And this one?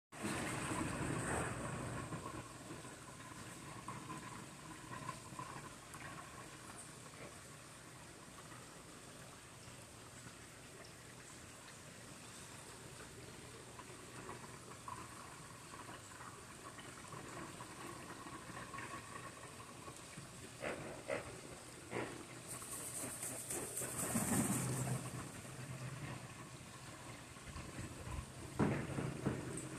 Sound effects > Animals
Water Birds - Aviary Ambience; Stream, Lesser Flamingos, Waldrapp Ibis Wing Flaps
Recorded with an LG Stylus 2022 at Chehaw Animal Park on January 13. I recorded red-ruffed lemurs the same day. Waldrapp ibis wing flapping at 0:22 timestamp.
aviary, bird, birds, flamingo, flap, ibis, lesser-flamingo, northern-bald-ibis, stream, wing, zoo